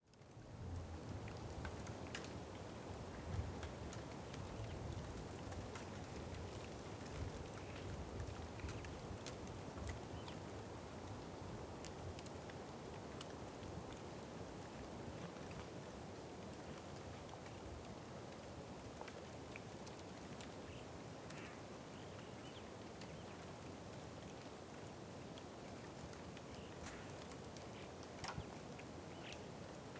Soundscapes > Nature
ambience 2 day mountain winter snow
field recording on mountain daytime, recorded with zoom h6
field-recording, winter, ambience, mountain, snow, soundscape